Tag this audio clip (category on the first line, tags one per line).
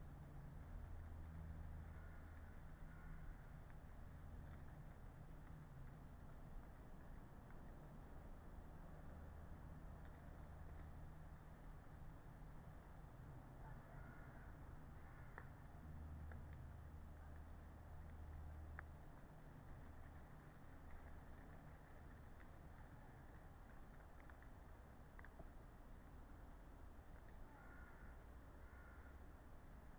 Soundscapes > Nature
soundscape
nature
artistic-intervention
Dendrophone
raspberry-pi
alice-holt-forest
modified-soundscape
phenological-recording
field-recording
data-to-sound
weather-data